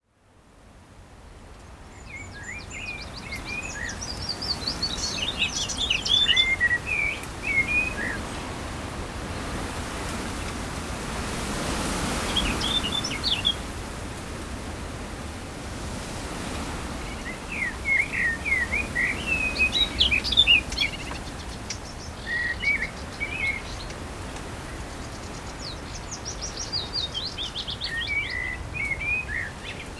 Soundscapes > Nature
A recording at RSPB Campfield marsh.